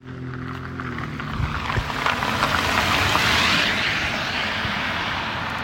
Soundscapes > Urban
Car passing Recording 29
Road, Cars